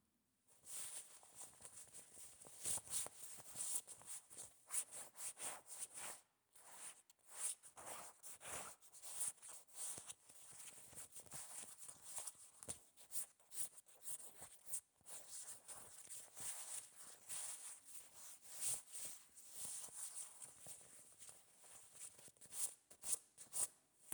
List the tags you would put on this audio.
Sound effects > Human sounds and actions
Cloth
Grabbing
Shirt

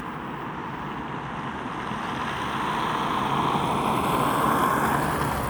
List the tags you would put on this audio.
Soundscapes > Urban
Car
vehicle

